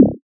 Instrument samples > Synths / Electronic
BWOW 4 Db
additive-synthesis, bass, fm-synthesis